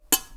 Sound effects > Other mechanisms, engines, machines
Woodshop Foley-072
shop, thud, knock, foley, sfx, tink, little, metal, wood, boom, tools, fx, rustle, bang, sound, strike, perc, crackle, pop, oneshot, bam, bop, percussion